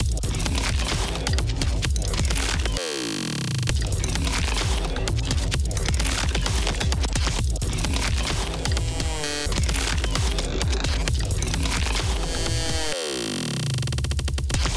Music > Other
Glitch Loop 02
digital, Glitch, loop, synthetic, electronic